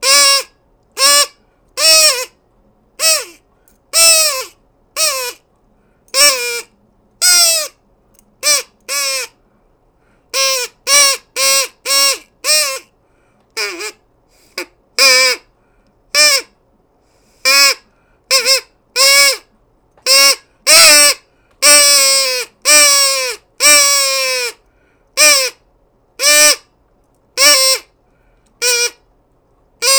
Sound effects > Animals
Crow calls. Also for cartoon.